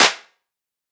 Instrument samples > Percussion
clap digital
made with vital
synthetic, clap